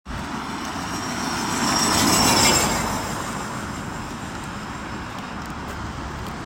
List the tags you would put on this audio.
Sound effects > Vehicles
car
field-recording
tampere

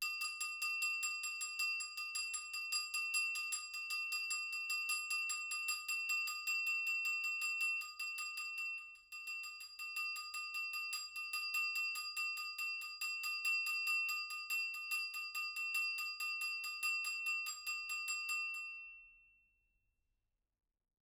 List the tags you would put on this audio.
Sound effects > Other
applause
cling
clinging
FR-AV2
glass
individual
indoor
NT5
person
Rode
single
solo-crowd
stemware
Tascam
wine-glass
XY

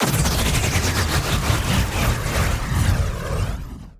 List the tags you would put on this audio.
Sound effects > Electronic / Design
electronic
evolving
game-audio
hit
Impact
processed
sfx
sound-design
synthetic

